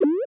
Sound effects > Electronic / Design
A sort of triangle wave drip / droop sound effect -- made with the Atlantis VSTi. I oringinally made this sound effect to use in place of the "Default Beep" sound on a customized installation of Windows XP.
alert, sfx, drip, ui, triangle, synth, interface, synthesized